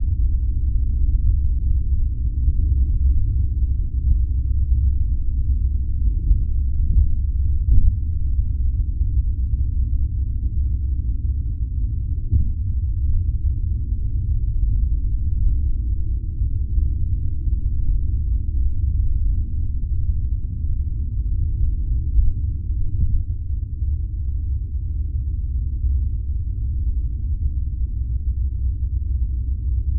Soundscapes > Urban
Car Driving Vibrations #002 via Low Frequency Geo Microphone
This sound is the vibrations of a Audi A4 Avant car while driving on the highway. It is recorded with a low frequency geo microphone. The microphone is placed in car on the dashboard. This sound is recorded with a Low Frequency Geo Microphone. This microphone is meant to record low-frequency vibrations. It is suitable for field recording, sound design experiments, music production, Foley applications and more. Frequency range: 28 Hz - >1000 Hz.
car, drive, driving, engine, field-recording, motor, road, vehicle